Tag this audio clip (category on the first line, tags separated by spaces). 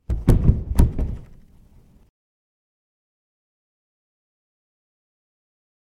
Sound effects > Human sounds and actions
drop fall